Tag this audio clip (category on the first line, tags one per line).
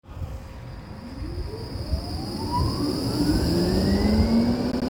Soundscapes > Urban
streetcar
tram